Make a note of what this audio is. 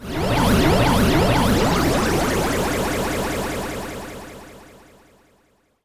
Sound effects > Experimental
Analog Bass, Sweeps, and FX-103
alien, analog, analogue, bass, basses, bassy, complex, dark, effect, electro, electronic, fx, korg, machine, mechanical, oneshot, pad, retro, robot, robotic, sample, sci-fi, scifi, sfx, snythesizer, sweep, synth, trippy, vintage, weird